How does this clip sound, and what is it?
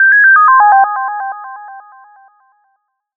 Sound effects > Electronic / Design
Signal Tone Arpeggio
Sinewave Arpeggiator Sound + Delay FX Sound Created in Ableton Live Suite 12 + Parawave Rapid Synth
fx, soundfx, signal, sine, arpeggiator, sinewave, sound